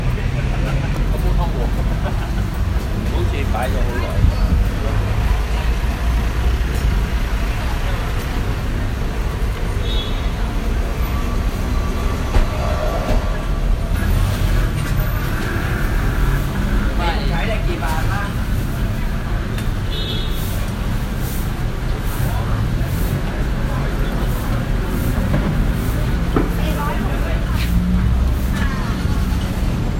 Soundscapes > Urban
Street Ambience, Bangkok, Thailand (Feb 22, 2019)
Urban soundscape recorded in the streets of Bangkok, Thailand, on February 22, 2019. Captures the noise of traffic, pedestrians, street vendors, and the general city atmosphere.
ambience, Bangkok, field, noise, pedestrians, recording, Thailand, traffic, urban, vendors